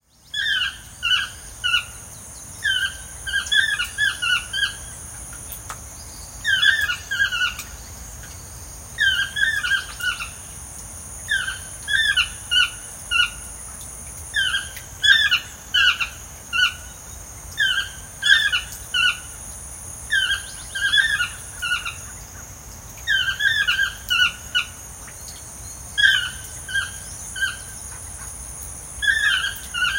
Soundscapes > Nature
Toucans calling
Two toucans are calling in La Fortuna, Costa Rica. Recorded with an iPhone 12 Pro.
birds, toucan, toucans